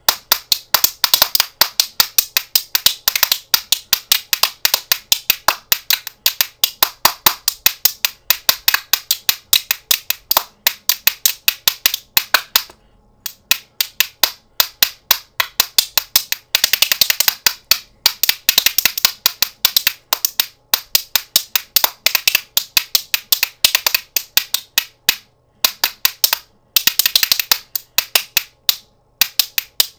Music > Solo percussion
MUSCPerc-Blue Snowball Microphone, CU Musical Spoons, Rhythmic Clacks Nicholas Judy TDC
Rhythmic musical spoons clacking.
Blue-brand, clack, Blue-Snowball, musical-spoons, rhythmic